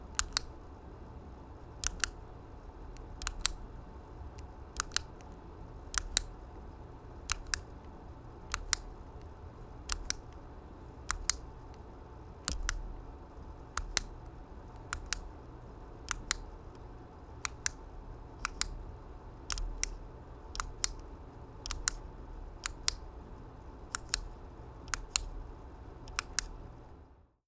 Sound effects > Objects / House appliances
MECHClik-Blue Snowball Microphone, CU Light Switch, Button Nicholas Judy TDC
A light switch button click.